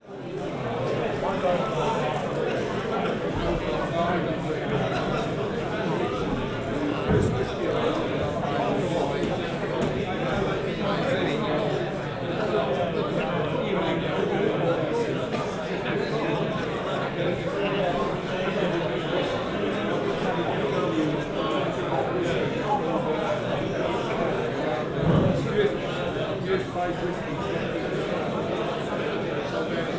Soundscapes > Indoors
Inside a busy beer festival on a "quiet" day - so no music, just lots and lots of people talking. Occasional chair being moved.
talking, festival, voices, ambiance, crowds